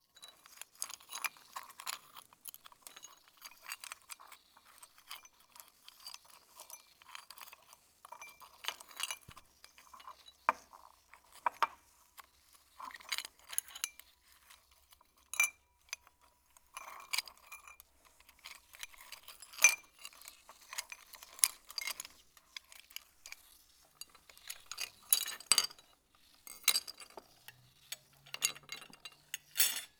Sound effects > Objects / House appliances
shells and quartz crystals rustling and scraping on marble
ceramic, crystal, drag, foley, fx, glass, natural, perc, percussion, quartz, scrape, sfx, tink